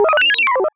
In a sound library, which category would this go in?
Sound effects > Other mechanisms, engines, machines